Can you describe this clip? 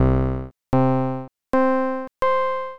Sound effects > Electronic / Design
just some cool thing idk
made with openmpt
notes, synth, note